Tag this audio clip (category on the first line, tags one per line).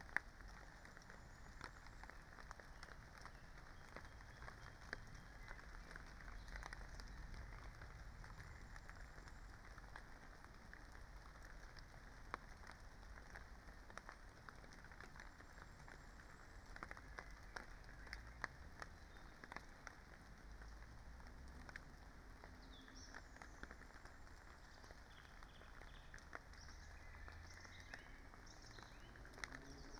Soundscapes > Nature
data-to-sound
Dendrophone
phenological-recording
raspberry-pi
weather-data